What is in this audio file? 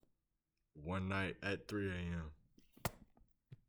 Sound effects > Human sounds and actions
one night at 3am